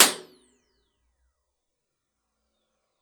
Sound effects > Other
Basement bathroom impulse response
Impulse response of the bathroom near my basement. Pretty small, bare concrete/tile walls and tile floor.
bathroom, ir, reverb, reverberation